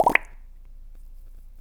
Sound effects > Objects / House appliances
mouth foley-002 bubbles
mouth sfx recorded with tascam field recorder
blow, bubble, mouth, squeek, whistle